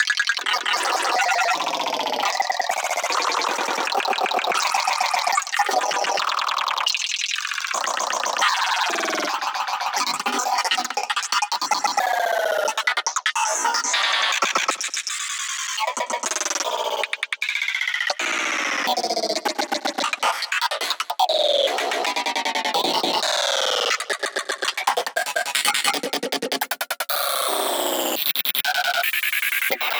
Sound effects > Electronic / Design
A chaotic FM synthesizer sample — shredded, gated, and violently modulated into thin, screeching bursts of sound — perfect for experimental resampling. Packed with glitchy stutters and rhythmic cuts, it’s a must-have for hitech, nightpsy, and forest psytrance. 150 bpm - G min